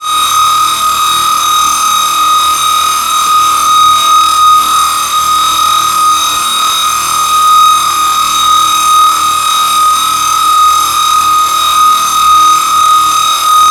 Sound effects > Electronic / Design
Sawing Atomosphare 1
I synth it with phasephant and 3xOSC! I was try to synth a zaag kick but failed, then I have a idea that put it into Granular to see what will happen, the result is that I get this sound.